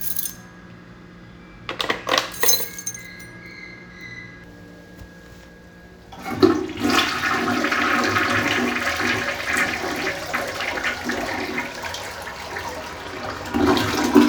Sound effects > Natural elements and explosions
WATRPlmb flush toilet water MPA FCS2
water, toilet, flush